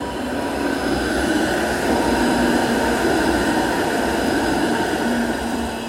Sound effects > Vehicles
tram rain 02
motor, rain, tram